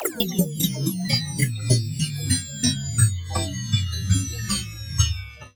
Sound effects > Electronic / Design
FX-Downlifter-Glitch Downlifter 5
Then I used Edison to resample it and put it into FLstudio sampler to tweak pogo, pitch and MUL amount.
Downlifter, Downsweep, Drop, Effect, FX, Glitch